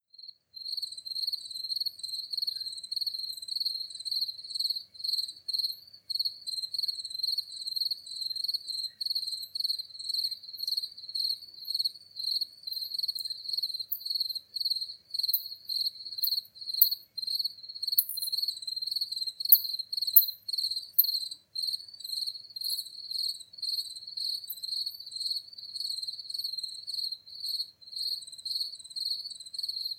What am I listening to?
Soundscapes > Nature
Night Crickets 02
Crickets at night in the garden. You can combine 734665 - Lighting a candle + 734627 - Dried branches + 734628 - Wood logs + this one, and you will have a very nice camp fire. * No background noise. * No reverb nor echo. * Clean sound, close range. Recorded with Iphone or Thomann micro t.bone SC 420.
outdoors, stars, evening, tent, ambience, ambient, cowboy, firecamp, insects, camp, cricket, nature, windy, field, field-recording, slumber, sleep, garden, nocturnal, wind, long, crickets, spring, camping, summer, night, campsite, ambiance